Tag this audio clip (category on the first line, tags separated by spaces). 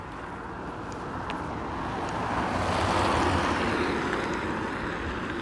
Soundscapes > Urban
car tampere vehicle